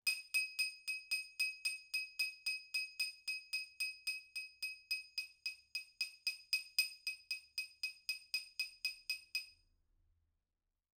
Sound effects > Other
Glass applause 33
applause, cling, clinging, FR-AV2, glass, individual, indoor, NT5, person, Rode, single, solo-crowd, stemware, Tascam, wine-glass, XY